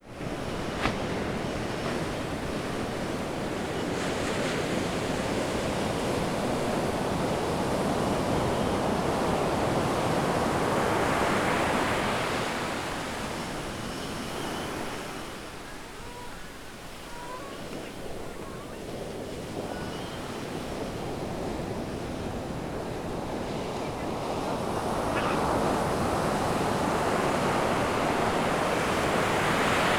Soundscapes > Nature
beach morning - 12.22.23
Waves break on the shore, and other voices can be heard in between waves. Santa Cruz, California